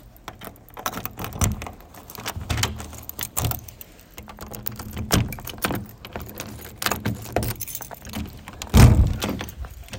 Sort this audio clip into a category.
Sound effects > Human sounds and actions